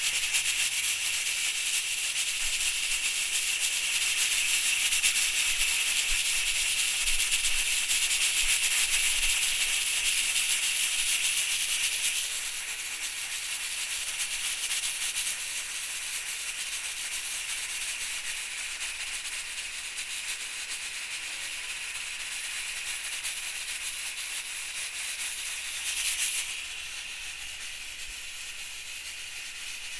Soundscapes > Indoors
Pressure cooker working Panela de pressão
domestic-sounds, paneladepressao, pressurecooker